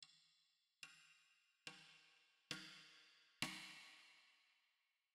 Music > Solo percussion
snare Processed - soft velocity build - 14 by 6.5 inch Brass Ludwig
snare, flam, drums, hit, kit, percussion, snares, fx, snaredrum, oneshot, drum, reverb, brass, realdrums, beat, sfx, crack, ludwig, rim, hits, realdrum, processed, rimshot, drumkit, snareroll